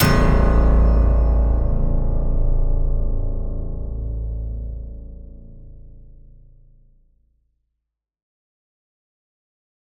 Music > Multiple instruments
Horror Sting (Harrowing String) 5
cinematic-sting, jumpscare-noise, dark-hit, horror-sting, intense, cinematic-stab, terrifying, jumpscare, horror-impact, horror-stings, suspenseful-hit, horror-stab, dylan-kelk, cinematic-hit, horror-hit